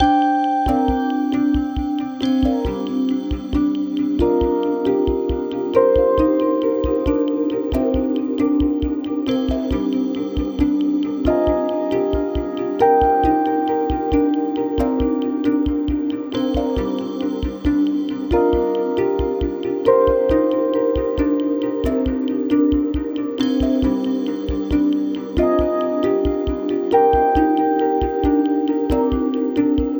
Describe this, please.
Music > Multiple instruments
Bail of Neds
mostly presets, Friktion plays D,C,D,C,G,D,F,G,A#,D# Objekt and Polytone alternate C,A#,C,C, Polytone plays G,A#,C,D# Kong dressed up as the Vermona DRM1 plays the Son Clave 68bpm